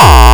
Instrument samples > Percussion
Gabbar Kick 5
Retouched multiple kicks in FLstudio original sample pack. Processed with ZL EQ, Waveshaper.